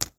Sound effects > Objects / House appliances
WOODBrk-Samsung Galaxy Smartphone, CU Twig, Snap Nicholas Judy TDC
A twig snap. Recorded at Hanover Pines Christmas Tree Farm.
Phone-recording, snap